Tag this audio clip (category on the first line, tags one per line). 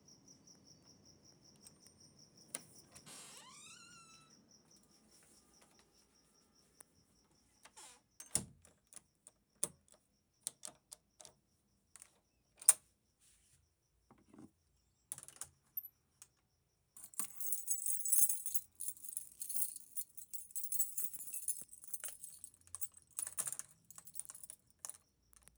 Sound effects > Objects / House appliances
Creak
Door
Keys